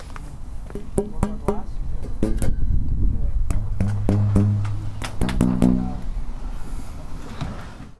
Sound effects > Objects / House appliances
Junkyard Foley and FX Percs (Metal, Clanks, Scrapes, Bangs, Scrap, and Machines) 151
tube, Metallic, Foley, Environment, Junkyard, dumpster, scrape, Perc, garbage, Atmosphere, Robotic, rattle, Bash, waste, Robot, Clang, Ambience, SFX, Dump, Clank, Machine, FX, Percussion, dumping, rubbish, trash, Metal, Junk, Smash, Bang